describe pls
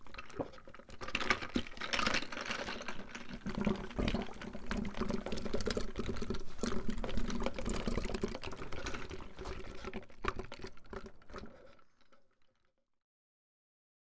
Sound effects > Human sounds and actions
Styrofoam cup, dropping ice and blowing bubbles
Blowing bubbles and dropping ice into a styrofoam cup. Honourable mention: Phonk artists are gonna see this and be like h*ll yeah lol.
Bubbles, Camping, Cubes, Cup, Ice, Phonk, Shaking, Styrofoam